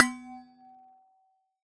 Sound effects > Objects / House appliances
Resonant coffee thermos-014
recording, percusive, sampling